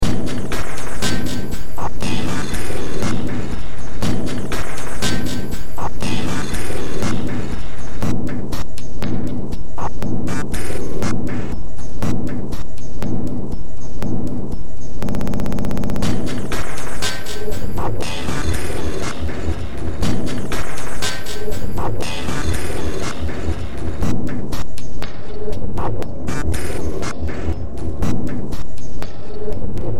Music > Multiple instruments
Demo Track #3619 (Industraumatic)
Track taken from the Industraumatic Project.